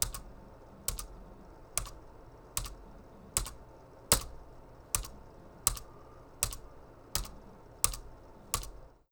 Sound effects > Objects / House appliances
CMPTKey-Blue Snowball Microphone, CU Enter Nicholas Judy TDC
Pressing an enter button on a keyboard.